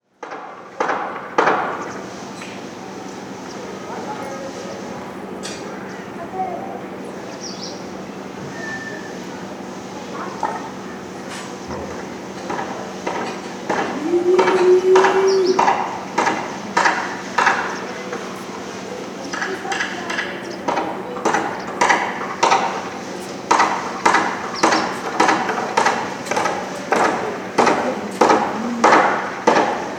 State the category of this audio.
Soundscapes > Urban